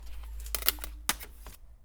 Sound effects > Other mechanisms, engines, machines
metal shop foley -162
tools, perc, crackle, pop, percussion, oneshot, metal, shop